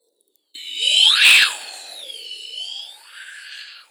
Sound effects > Objects / House appliances
weird blow and whistle mouth foley-015
industrial, drill, stab, metal, bonk, sfx, perc, oneshot, fx, hit, clunk, glass, foundobject, percussion, foley, mechanical, fieldrecording, natural, object